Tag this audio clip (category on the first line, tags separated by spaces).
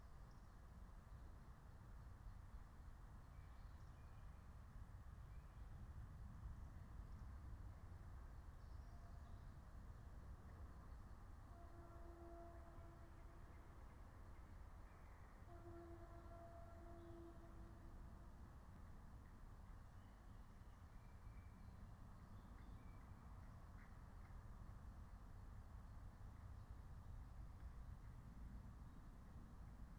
Soundscapes > Nature
phenological-recording natural-soundscape meadow nature soundscape raspberry-pi alice-holt-forest field-recording